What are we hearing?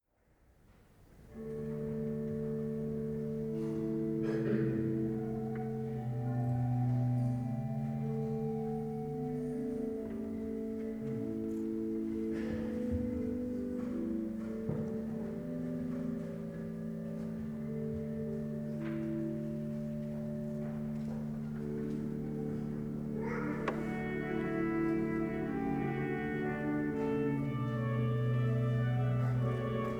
Music > Solo instrument
010A 100111 0149-2 FR Music Holy Mass La Lucerne
Music from a Holy Mass in La Lucerne Abbey (15th file). Please note that this audio file has kindly been recorded by Dominique LUCE, who is a photographer. Fade in/out applied in Audacity.
church, kids, Mass, religion, monastery, people, ambience, voices, Abbaye-de-la-Lucerne, children, field-recording, Holy, religious, France, La-Lucerne-Abbey, organ, soundscape, atmosphere, catholic, child, music